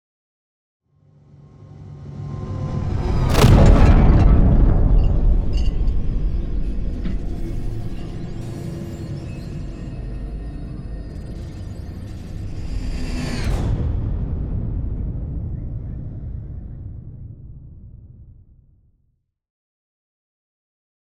Sound effects > Other
Sound Design Elements SFX PS 074
Effects recorded from the field.
bass, boom, cinematic, deep, effect, epic, explosion, game, hit, impact, implosion, indent, industrial, metal, movement, reveal, riser, stinger, sub, sweep, tension, trailer, transition, video, whoosh